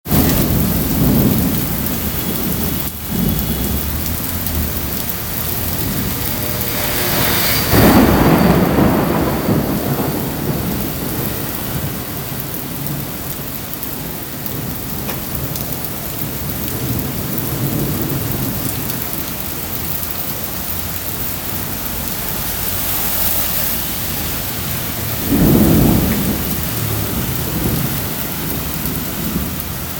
Soundscapes > Nature
Rain and Thunder Loop
2025/5/22 Taipei,Taiwan Rain, thunder and traffic